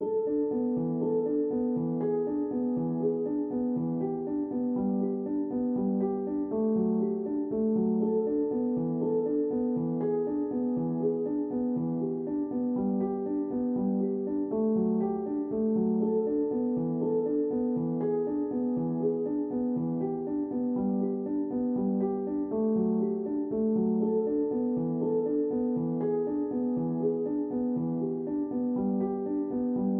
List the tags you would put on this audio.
Music > Solo instrument
free
loop
music
pianomusic
reverb
samples
simple

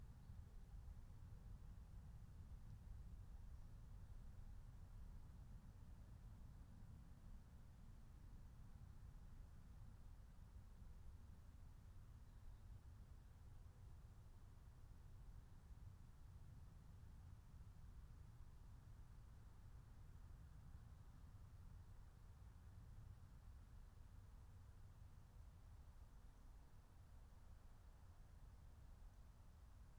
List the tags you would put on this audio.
Soundscapes > Nature
alice-holt-forest
field-recording
meadow
natural-soundscape
nature
phenological-recording
raspberry-pi
soundscape

